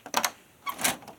Sound effects > Objects / House appliances
Small hatch opening. Recorded with my phone.